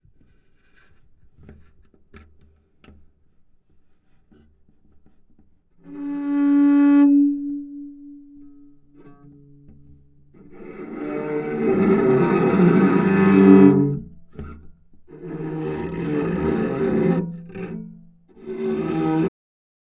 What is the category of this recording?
Instrument samples > String